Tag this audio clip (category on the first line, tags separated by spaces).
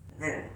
Sound effects > Animals
calf baby zoo oryx grunt antelope